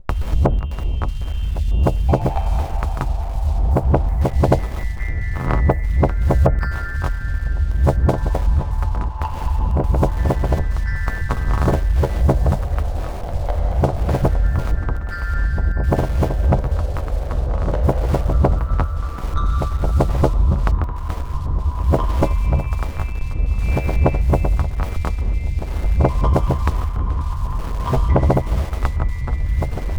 Soundscapes > Synthetic / Artificial
Atonal Granular Texture for Ambient Soundscapes with Blops #001
Atonal ambient texture done with Torso S4 It has a lot frequency component and high frequency granular on top Blops popping out here and there created by longer filter decay